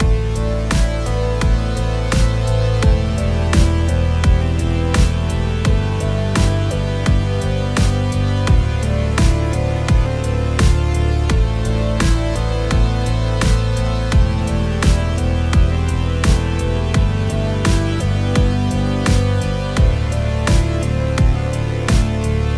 Music > Multiple instruments
Nostalgic Game Loop - The Sunset
I’ll be happy to adjust them for you whenever I have time!
loop, game, loopable, nostalgic, seamless, 85bpm, melancholic